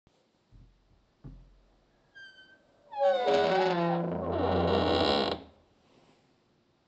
Soundscapes > Indoors
Creaking wooden door v12
Door, Room, Wooden